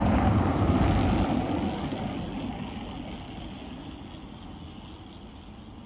Sound effects > Vehicles
final bus 15
bus, finland, hervanta